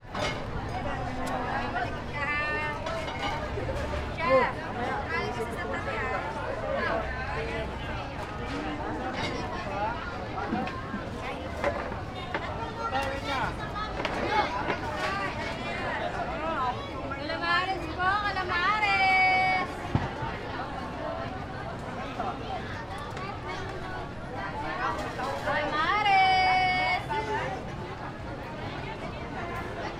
Soundscapes > Urban
250730 162907 PH Night market in Calapan
Night market in Calapan city. I made this recording in a small outdoor market where you can find many kinds of street food (called night market even if it’s also opened in the afternoon and evening), in the downtown of Calapan city (oriental Mindoro, Philippines). One can hear the vendors selling their yummy food, as well as lots of customers (mostly students) passing by, chatting and buying. Recorded in July 2025 with a Zoom H5studio (built-in XY microphones). Fade in/out applied in Audacity.
afternoon, ambience, atmosphere, busy, buyers, buying, Calapan-city, conversations, customers, evening, field-recording, food, hubbub, lively, market, outdoor, people, Philippines, sellers, selling, soundscapes, street-food, students, urban, vendors, voices, walla